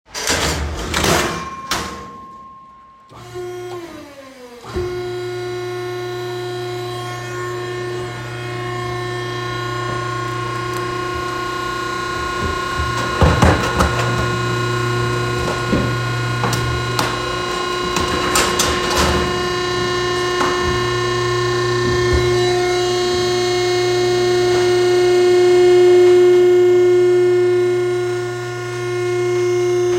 Soundscapes > Indoors
recorded the baler with my iPhone again at work. Who knows, maybe I'll do a 5th.
crushing, cardboard, mechanical, machinery, industrial, crush, factory, machine, box, machiner, baler